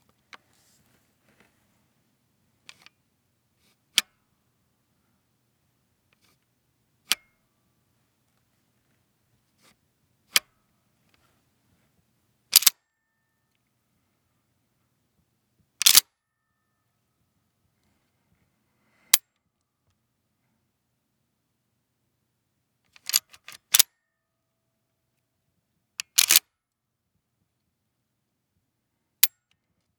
Sound effects > Other mechanisms, engines, machines
Racking and unracking a Remington 870's pump. REMINGTON 870 PUMP